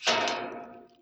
Sound effects > Objects / House appliances
Metal Canister
Light, Metal, Goal